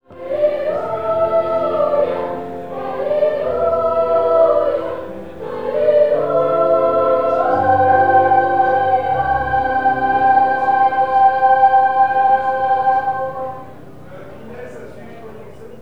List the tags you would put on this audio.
Soundscapes > Other
religion choir child religious choral

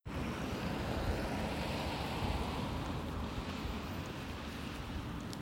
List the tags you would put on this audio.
Soundscapes > Urban
tampere car vehicle

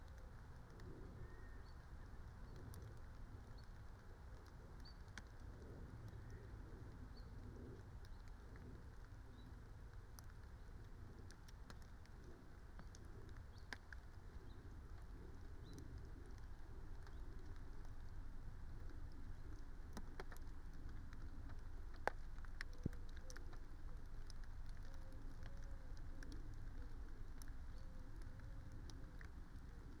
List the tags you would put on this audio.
Soundscapes > Nature
nature meadow field-recording phenological-recording natural-soundscape raspberry-pi alice-holt-forest soundscape

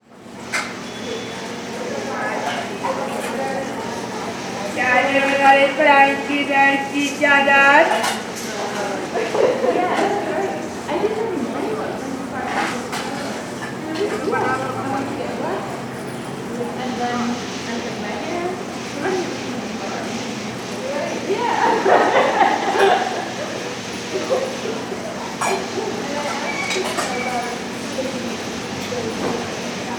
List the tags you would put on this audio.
Soundscapes > Urban
bells Hindu Hinduism Temple Yoga

